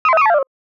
Sound effects > Other mechanisms, engines, machines
Small Robot - Talk 3
A talkative sounding small robot, bleeping. I originally designed this for some project that has now been canceled. Designed using Vital synth and Reaper
artificial, bleep, computer, digital, game, robot, science-fiction, sci-fi